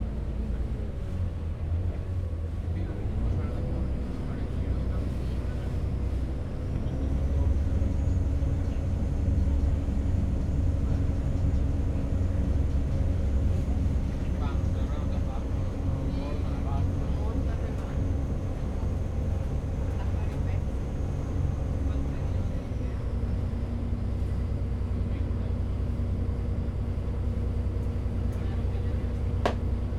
Soundscapes > Indoors
On the Battello Boat - Biennale Exhibition Venice 2025
On the boat from the train station to the exhibition. The boat is a classic Venice bus boat called "battello" Sound recorded while visiting Biennale Exhibition in Venice in 2025 Audio Recorder: Zoom H1essential
biennale, boat, exhibition, field-recording, people, talking, venice, voices